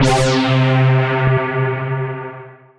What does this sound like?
Instrument samples > Synths / Electronic
CVLT BASS 126
bass, bassdrop, clear, drops, lfo, low, lowend, stabs, sub, subbass, subs, subwoofer, synth, synthbass, wavetable, wobble